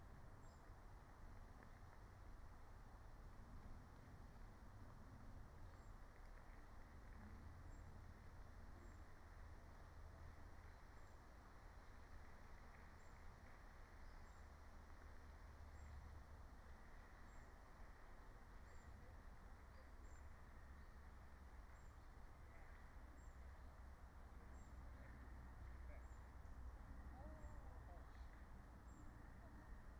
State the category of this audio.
Soundscapes > Nature